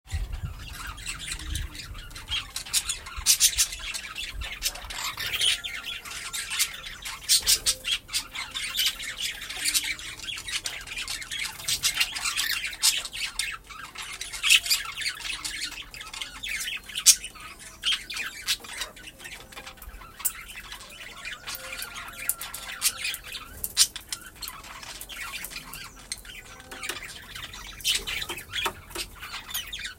Soundscapes > Nature
pássaros - birds
som de pássaros. sound of birds. Recorded on Thursday, April 25th, around 15:30 pm, with a cellphone microphone in the outdoors (mild weather conditions, with no to little wind), less than a meter away from the source.
field-recording, birds, nature